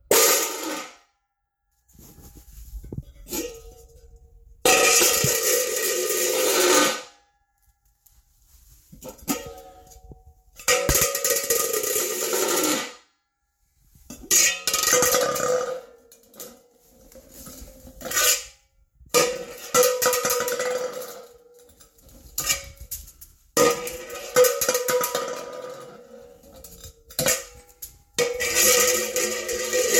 Sound effects > Objects / House appliances
METLImpt-Samsung Galaxy Smartphone, CU Hubcap, Drop, Spin Nicholas Judy TDC
A hubcap dropping and spinning.
Phone-recording, drop, spin, hubcap